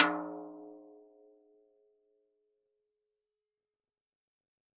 Music > Solo percussion
Hi Tom- Oneshots - 7- 10 inch by 8 inch Sonor Force 3007 Maple Rack
Hi-Tom recording made with a Sonor Force 3007 10 x 8 inch Hi-Tom in the campus recording studio of Calpoly Humboldt. Recorded with a Beta58 as well as SM57 in Logic and mixed and lightly processed in Reaper